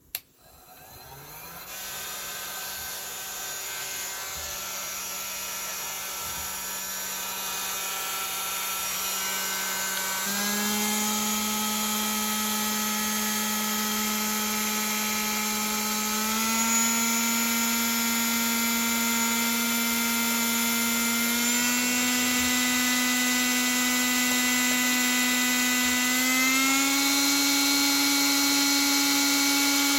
Sound effects > Objects / House appliances
TOOLPowr-Samsung Galaxy Smartphone, CU Fine Tool, Start, Run in Various Speeds, Low to High to Low, Shut Off Nicholas Judy TDC
A fine tool start, run in various speeds low to high to low and shut off.